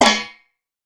Sound effects > Other mechanisms, engines, machines
Shield block-01
Shield block sound effect. Recorded on Rode-NT-1A
block, Rode-NT-1A, SHIELD